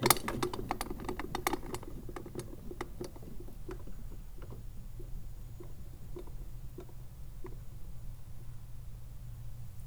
Sound effects > Other mechanisms, engines, machines
Woodshop Foley-061
bam, bang, boom, bop, crackle, foley, fx, knock, little, metal, oneshot, perc, percussion, pop, rustle, sfx, shop, sound, strike, thud, tink, tools, wood